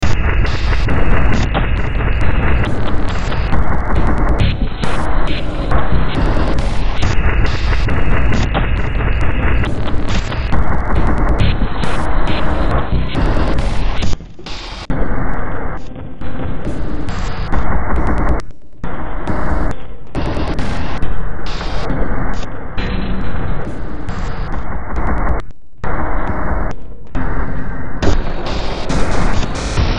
Music > Multiple instruments
Demo Track #3159 (Industraumatic)
Soundtrack, Cyberpunk, Sci-fi, Industrial, Ambient, Noise, Underground, Horror, Games